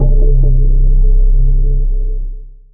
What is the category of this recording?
Instrument samples > Synths / Electronic